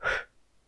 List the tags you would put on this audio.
Sound effects > Human sounds and actions
Game Blow

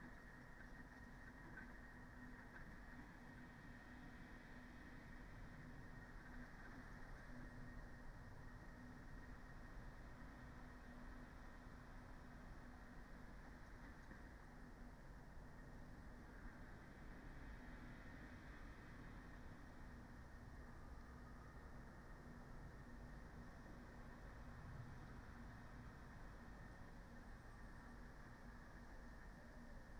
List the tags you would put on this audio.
Soundscapes > Nature
phenological-recording natural-soundscape weather-data alice-holt-forest soundscape data-to-sound raspberry-pi sound-installation field-recording Dendrophone modified-soundscape artistic-intervention nature

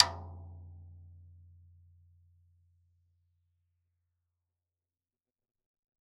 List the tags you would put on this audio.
Music > Solo percussion
velocity rimshot beats percs drums floortom fill rim drum beat acoustic studio percussion drumkit flam roll toms kit tom beatloop perc tomdrum oneshot instrument

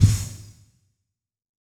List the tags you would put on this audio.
Sound effects > Natural elements and explosions
shoot videogames

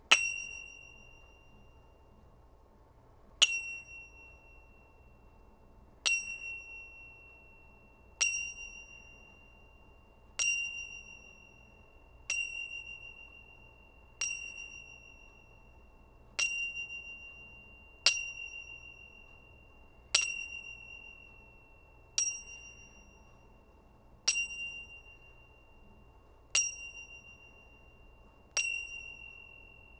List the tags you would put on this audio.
Music > Solo percussion
ding clang Blue-Snowball finger-cymbals Blue-brand

